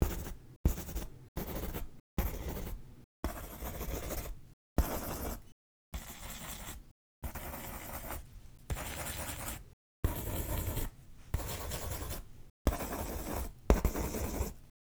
Sound effects > Objects / House appliances
Pencil scribbles/draws/writes/strokes fast for a short amount of time.